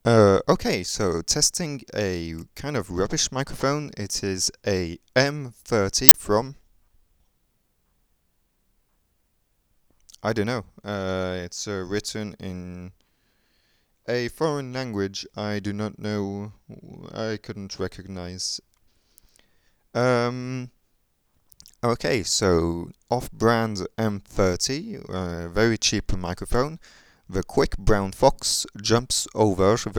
Speech > Solo speech
Subject : Testing a cheap "m30" mic someone gave to me, handheld plug-in power thing. Date YMD : 2025 06 05 - 16h Location : Albi France Hardware : Tascam FR-AV2, M30. Weather : Sunny day, mostly blue ideal pockets of clouds. 23°c 10km/h wind. Processing : Trimmed and Normalized in Audacity. Probably some fade in/out.